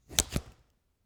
Sound effects > Objects / House appliances
250726 - Vacuum cleaner - Philips PowerPro 7000 series - connecting handle and tube
Subject : A sound from my pack of my brand spanking new Philips PowerPro 7000 series vacuum cleaner. Date YMD : 2025 July 26 Location : Albi 81000 Tarn Occitanie France. Sennheiser MKE600 with P48, no filter. Weather : Processing : Trimmed and normalised in Audacity.